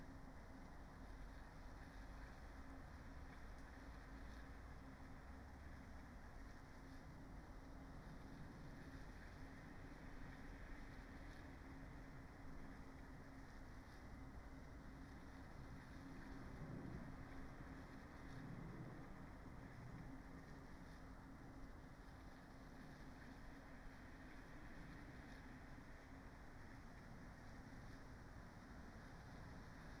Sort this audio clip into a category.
Soundscapes > Nature